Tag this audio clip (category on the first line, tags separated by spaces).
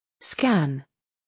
Speech > Solo speech
english pronunciation